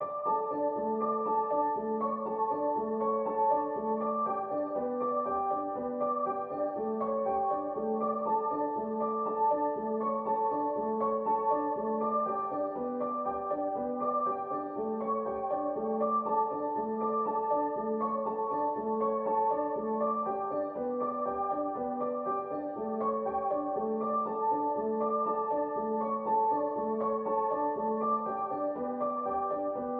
Music > Solo instrument
Piano loops 198 efect octave long loop 120 bpm
120, 120bpm, free, loop, music, piano, pianomusic, reverb, samples, simple, simplesamples